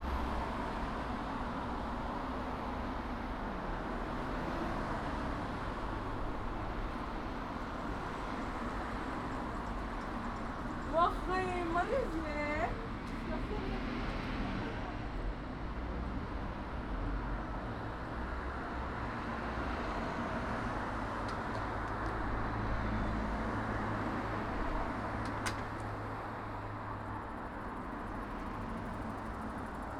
Sound effects > Vehicles
Traffic outside of window
An old recording of traffic/ambience outside my bedroom window. I don't live there anymore, thankfully. The street was always quite noisy. Recorded with a Zoom H1.
car, cars, city, noise, road, street, traffic